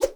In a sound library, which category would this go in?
Sound effects > Objects / House appliances